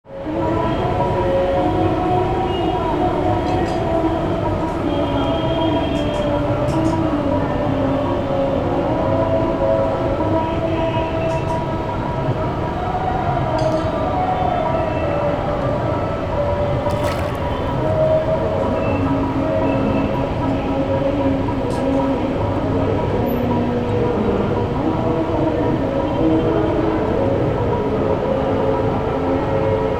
Soundscapes > Urban
Loud India (Gita Bhavan Ashram)
Sound recorded in India where I explore the loudness produced by human activity, machines and environments in relation with society, religion and traditional culture.
bells, Hindu, Hinduism, Temple, Yoga